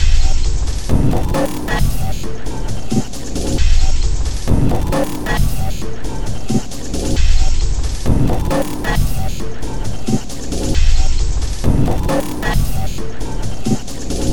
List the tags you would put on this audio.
Instrument samples > Percussion
Alien
Ambient
Underground
Samples
Dark
Industrial
Weird
Drum
Packs
Loop
Loopable
Soundtrack

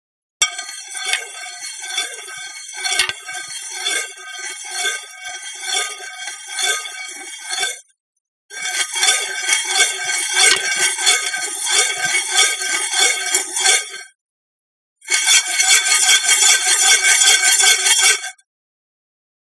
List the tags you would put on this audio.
Sound effects > Objects / House appliances
kitchen
liquid
pot
stir
stiring

